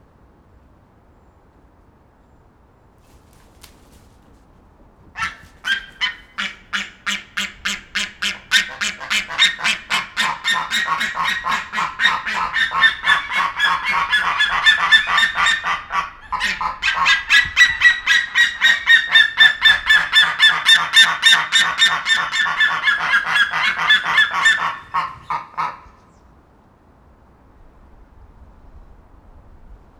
Soundscapes > Other
HIID-MERIKOTKAS
Two Steller’s sea eagles recorded at Tallinn Zoo on 16 November 2025 using a DPA 2017 shotgun microphone. (Hawk Mountain) HIID-MERIKOTKAD (kaks tk.) salvestatud Tallinna Loomaaias 11-16-25 DPA 2017 Shotgun mikrofoniga Kullimäel. Salvestaja: Sound Devices MixPre-6 II.